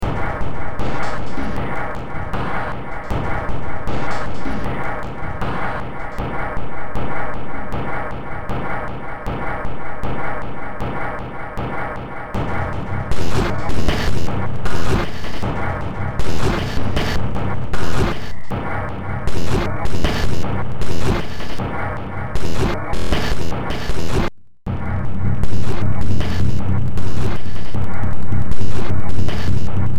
Music > Multiple instruments
Short Track #3563 (Industraumatic)
Ambient, Cyberpunk, Games, Horror, Industrial, Noise, Sci-fi, Soundtrack, Underground